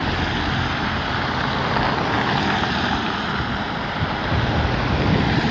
Soundscapes > Urban
cars driving past in rain
car, engine, vehicle